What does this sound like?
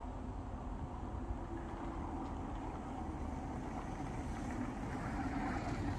Sound effects > Vehicles
final bus 16
hervanta, bus, finland